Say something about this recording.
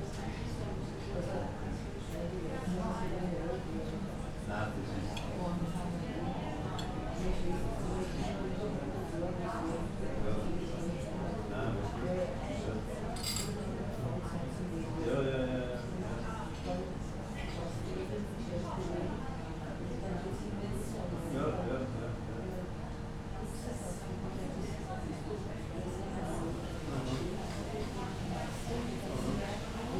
Soundscapes > Indoors
AMBRest Café Jelinek Restaurante Ambience Walla 02 Vienna
Noon Ambience at Café Jelinek Vienna recorded with Clippy EM272 in AB Stereo into ZoomF3